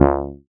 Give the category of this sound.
Instrument samples > Synths / Electronic